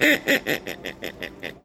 Sound effects > Animals
A duck laughing. Recorded at Lowe's